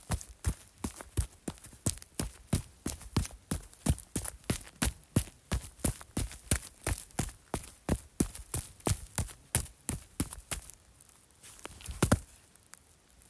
Sound effects > Objects / House appliances
Running on concrete with jump and landing
Thanks. i’ll make it a little scavenger hunt for me
concrete, footsteps, landing, movement, parkour, running, shoes